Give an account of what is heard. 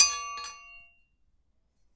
Sound effects > Other mechanisms, engines, machines
metal shop foley -017
bang, foley, fx, little, perc, shop, sound, strike, thud, tink, tools